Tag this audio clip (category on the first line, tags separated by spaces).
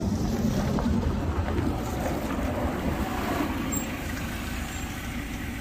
Sound effects > Vehicles
hervanta bus